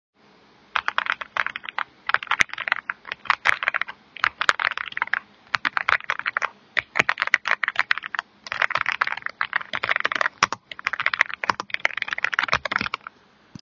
Sound effects > Objects / House appliances
13-second keyboard typing audio
keyboard, writing, typing